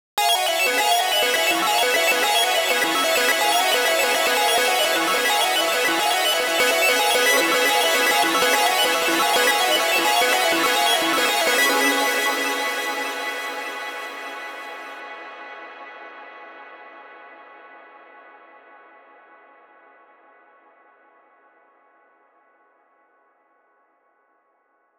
Music > Solo instrument
lost in the circuitry - 120 bpm
A rushing cascade of chiming sounds to create an impression of a character lost within a computer or in a rapidly changing or unstable digital environment. Made with my MIDI keyboard + GarageBand + BandLab
ambient, atmosphere, electronic, loop, music, rhythmic, synth